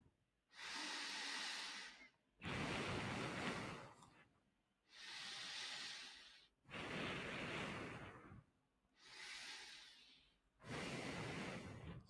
Sound effects > Human sounds and actions
Breathing - Male
I needed a sample of breathing, could not find one so had to make one myself.
human, man, Breathing